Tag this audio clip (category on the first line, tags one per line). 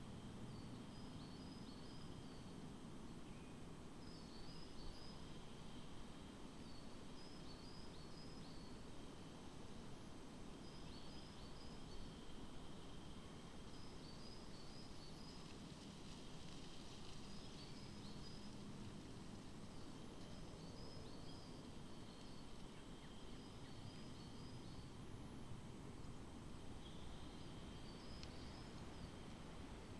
Soundscapes > Nature
alice-holt-forest
data-to-sound
modified-soundscape
natural-soundscape
raspberry-pi
soundscape